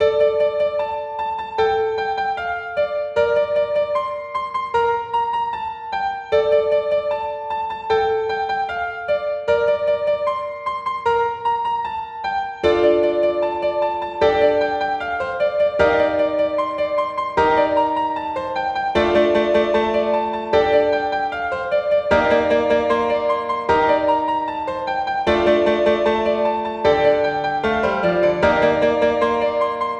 Music > Solo instrument
Tisserand Klaviersonate 2025
A little piece of music that came up while playing around with the music software. Enjoy it and use it for your projects as you wish.
film, instrumental, piano